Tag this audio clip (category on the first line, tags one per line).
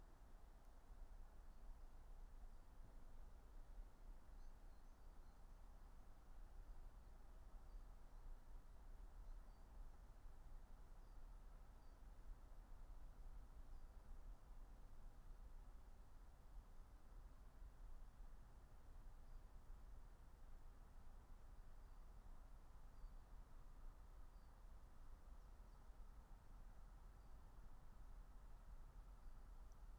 Soundscapes > Nature
soundscape
field-recording
nature
raspberry-pi
meadow
natural-soundscape
phenological-recording
alice-holt-forest